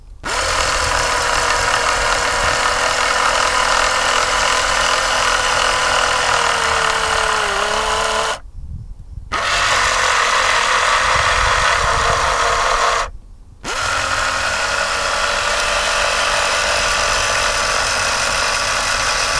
Sound effects > Vehicles
Ford 115 T350 - Motorized side mirror
Ford, Tascam, A2WS, FR-AV2, 2003, Vehicle, 115, Ford-Transit, August, Single-mic-mono, Old, SM57, France, 2025, Van, T350, Mono, 2003-model